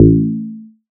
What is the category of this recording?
Instrument samples > Synths / Electronic